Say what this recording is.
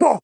Speech > Solo speech
Random Brazil Funk Volcal Oneshot 8
Recorded with my Headphone's Microphone, I was speaking randomly, and tightened my throat. I even don't know that what did I say，and I just did some pitching and slicing works with my voice. Processed with ZL EQ, ERA 6 De-Esser Pro, Waveshaper, Fruity Limiter.
Acapella, BrazilFunk, EDM, Oneshot, Volcal